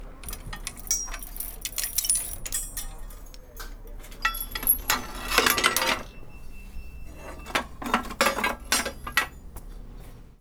Sound effects > Objects / House appliances
Junkyard Foley and FX Percs (Metal, Clanks, Scrapes, Bangs, Scrap, and Machines) 170
rattle, Metal, Dump, Clang, dumpster, SFX, Perc, Metallic, dumping, Junkyard, Percussion, garbage, Junk, Environment, tube, Atmosphere, Bash, Robot, Foley, waste, FX, Ambience, Clank, rubbish, Machine, Robotic, trash, Smash, scrape, Bang